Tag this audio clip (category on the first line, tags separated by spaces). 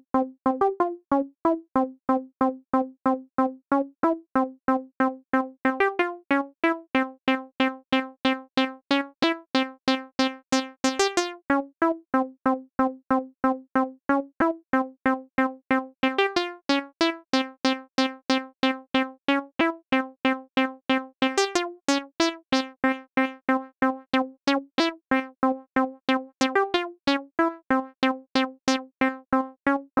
Music > Solo instrument
hardware 303 Recording Acid techno TB-03 electronic house Roland synth